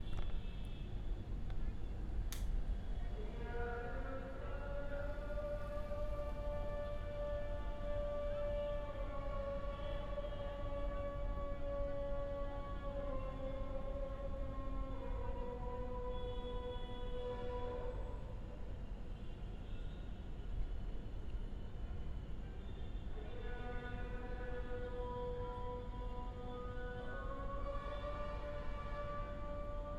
Soundscapes > Urban
Inde voiture et chant 2
In Jaipur, India,9PM 08/08/2016 Cars klaxons and a man sing